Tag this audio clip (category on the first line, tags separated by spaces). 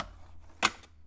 Sound effects > Other mechanisms, engines, machines
crackle rustle wood percussion strike tink tools foley knock thud metal sfx bop sound oneshot fx shop boom little perc bam bang pop